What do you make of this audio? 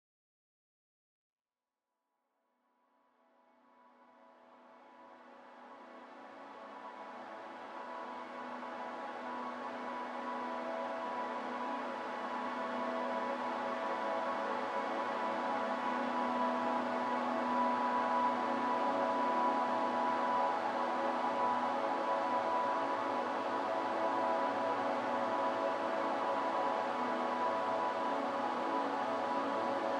Music > Solo instrument
Ambient F Sharp Minor Ethereal Choir Pad 1 // a super blurry ambient ethereal layered choir paulstretched soundscape, super peaceful and magical and soothing and emotional